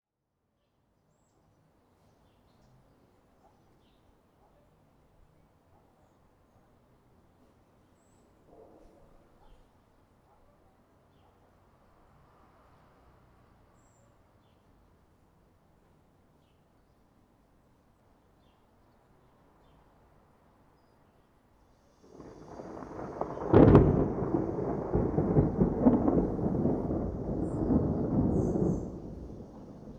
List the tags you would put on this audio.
Soundscapes > Nature
bird
traffic
thunderstrike
chirp
strike
moderate
plastic
dog
metal
perspective
thunder
rain
bark

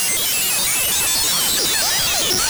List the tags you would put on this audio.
Sound effects > Electronic / Design
digital
noise
glitch
freaky
sfx

